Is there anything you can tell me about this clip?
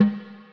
Music > Solo percussion
Snare Processed - Oneshot 163 - 14 by 6.5 inch Brass Ludwig
acoustic, ludwig, reverb, snaredrum, perc, processed, rimshot, flam, roll, crack, beat, drums, brass, drumkit, snares, rimshots, percussion, oneshot, realdrums, snareroll, fx, snare, realdrum, hit, kit, sfx, drum, rim, hits